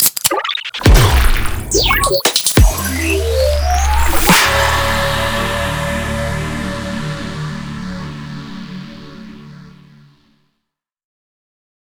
Sound effects > Electronic / Design
DSGNMorph Energy Drink Sound Logo, Sonic Brand
Created this little sound collage with my own samples and recordings. Imagined it might be for an energy drink advertisement or something. lol inside look at the project file here below.
advertisement, caffeine, adspot, energy, intro, sound, soda, creators, sonic, drink, web, branding, logo, podcast, video